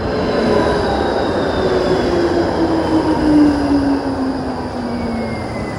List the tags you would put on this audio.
Sound effects > Vehicles
city Tram urban

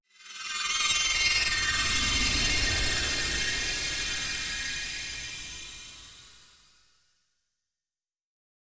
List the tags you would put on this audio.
Sound effects > Electronic / Design
designed high-pitched magic reverse shimmer sweeping tremelo

